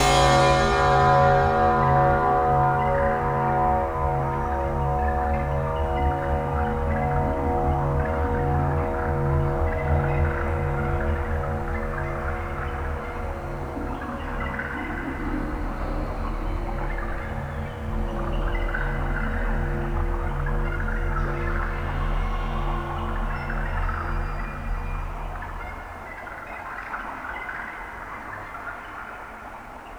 Sound effects > Experimental
Scrap Gong in Alien Landscape
A loud and booming Gong hit that echoes through an alien landscape of weird sounding creatures, insects, and alien birds. Created using scrap metal hits from a local junkyard and assorted bird and insect sounds recorded around Humboldt County Redwoods, using a Tascam DR05 , processed and layered in Reaper using Izotope, Minimal Audio, and Fab Filter VSTs
abstract, birds, boom, cymbal, dark, gong, hit, insects, metallic, monster, otherworldly, sci-fi, scrap, sound-design, strange, weird